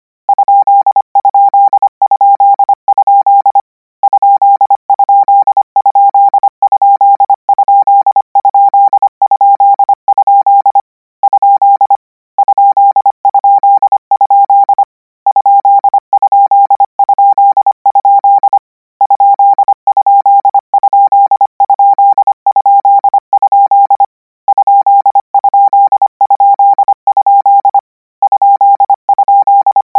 Sound effects > Electronic / Design
Koch 31 ? - 200 N 25WPM 800Hz 90%
Practice hear symbol '?' use Koch method (practice each letter, symbol, letter separate than combine), 200 word random length, 25 word/minute, 800 Hz, 90% volume.